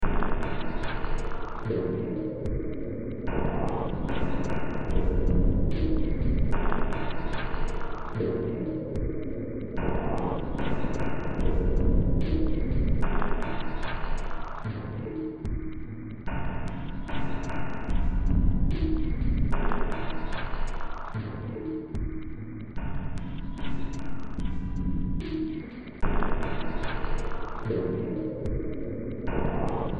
Music > Multiple instruments
Demo Track #3852 (Industraumatic)
Ambient, Cyberpunk, Games, Horror, Industrial, Noise, Sci-fi, Soundtrack, Underground